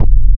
Instrument samples > Percussion
tom bass sine 1
tom, floor, ultrabass, Tama, deepbass, bassthrob, drum, low-end, Pearl, basshum, Sonor, strike, foundation, sinewaves, soundbuilding, subspectral, superbass, floortom, sinewave, harmonics, basstom, bass, sinemix, sinusoid, megabass, 56-Hz, bassbase, 28-Hz, basspulse